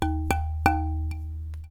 Music > Solo instrument
Marimba Loose Keys Notes Tones and Vibrations 38-001
woodblock, tink, marimba, foley, fx, oneshotes, perc, wood, notes, percussion, thud, loose, keys, rustle, block